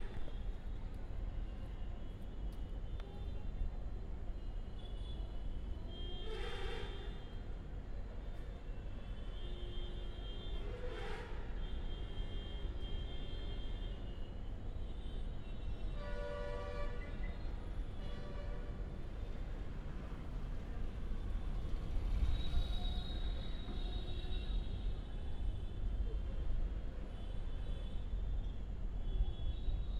Soundscapes > Urban
Inde cars klaxons far away
In Jaipur, India,9PM 08/08/2016 Cars klaxons and a man sing far away